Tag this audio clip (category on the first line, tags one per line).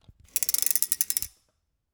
Sound effects > Objects / House appliances
Powerpro-7000-series
Sennheiser
aspirateur
Powerpro
MKE-600
FR-AV2
Shotgun-microphone
Shotgun-mic
vacuum-cleaner
Single-mic-mono
cleaner
7000
Tascam
vacuum
Hypercardioid
Vacum
MKE600